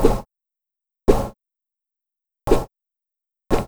Sound effects > Objects / House appliances
A small stick swishes. Four times.